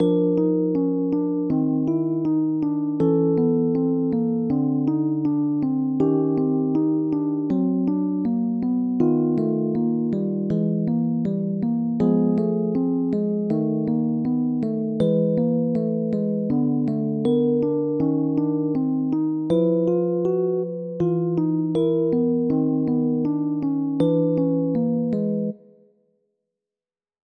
Music > Multiple instruments
Scary short music clip made with fl studio